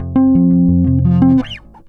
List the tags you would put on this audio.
Instrument samples > String
bass blues charvel electric funk fx loop loops mellow oneshots pluck plucked riffs rock slide

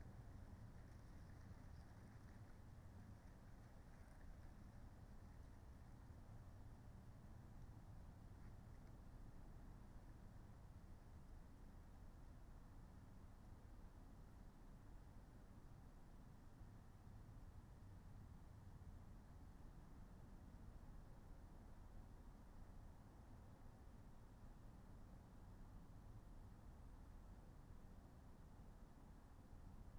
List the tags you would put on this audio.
Soundscapes > Nature
raspberry-pi field-recording alice-holt-forest Dendrophone weather-data sound-installation phenological-recording artistic-intervention nature data-to-sound modified-soundscape natural-soundscape soundscape

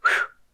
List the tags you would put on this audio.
Sound effects > Human sounds and actions
Blow Game Puff

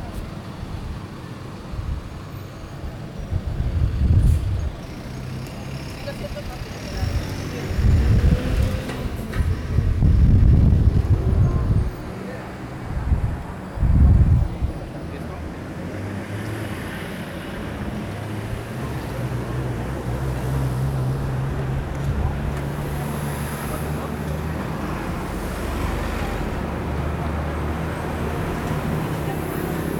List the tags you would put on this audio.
Soundscapes > Urban
city field-recording noise street Walking

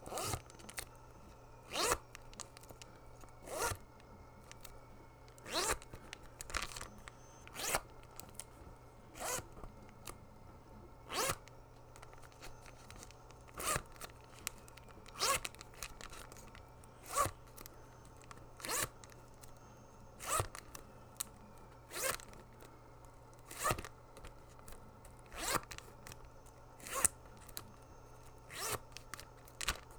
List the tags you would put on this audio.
Sound effects > Objects / House appliances
plastic-bag foley Blue-Snowball Blue-brand unzip

